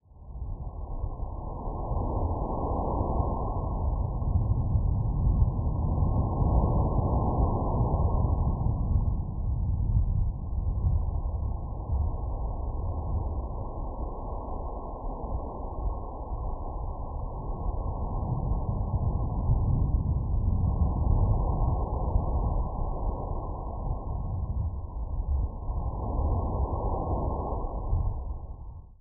Soundscapes > Other
Spooky Pulsing Ambience
A spooky pulse or ambience, great for looping in the background of a scene or in a game. This is a recording of the Pacific ocean's waves crashing inside of a cave. Then I used a brickwall High-pass and Low-Pass which resulted in this weird ringing around 1K. I'm not sure why a brickwall slope causes this (Ozone EQ specifically).
Castle, Atmosphere, Ambience, Ocean, Tone, Pulse, Horror, Free